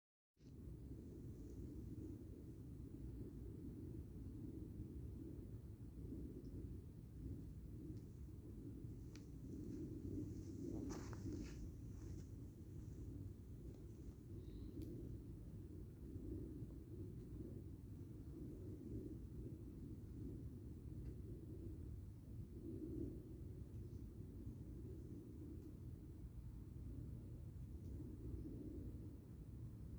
Soundscapes > Nature
My garden 6:00 in the morning
Sound of my Garden (Apeldoorn, Netherlands) at 6:00 in the morning.